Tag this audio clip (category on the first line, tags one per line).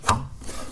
Sound effects > Other mechanisms, engines, machines
bam
knock
sfx
little
wood
fx